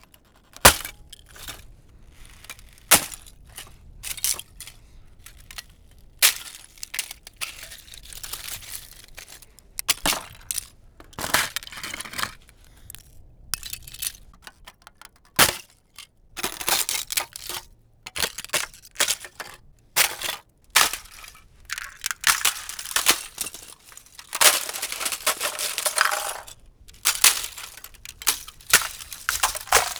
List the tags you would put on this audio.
Soundscapes > Nature
Breaking ice shards

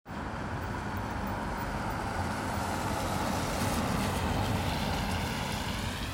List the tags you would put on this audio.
Sound effects > Vehicles
rain tampere

